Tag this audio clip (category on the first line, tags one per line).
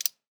Sound effects > Human sounds and actions
activation
button
click
interface
off
switch
toggle